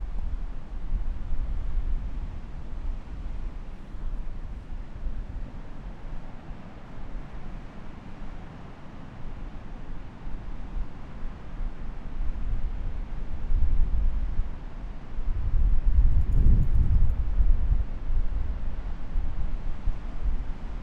Soundscapes > Nature
Manzanita, 03/2025, windy beach day
A short clip of a windy beach day.
beach, daytime, kids, manzanita, oregon, waves, wind